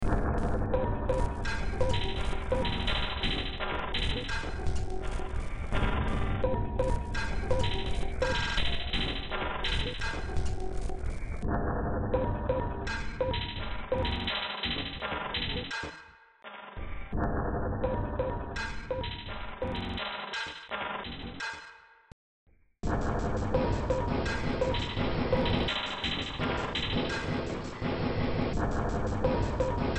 Music > Multiple instruments
Demo Track #3037 (Industraumatic)
Soundtrack, Games, Underground, Noise, Ambient, Cyberpunk, Industrial, Horror